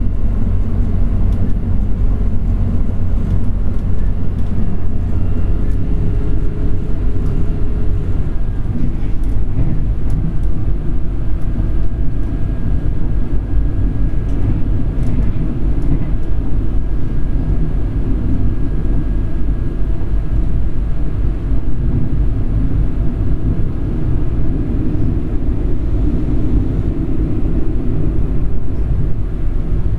Soundscapes > Urban
Train interior
The room tone of a running train
train, subway, locomotive, ambience, station